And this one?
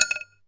Sound effects > Objects / House appliances
Ice cube falling in glass 5
Ice cube falling into a glass
clinking, rattle, glasses, ice, clink, glass, ice-cube, drop, dink